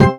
Instrument samples > Other
Orchestral hit 2
Furnace-tracker, Orchestra, Orchestral, Orchestral-hit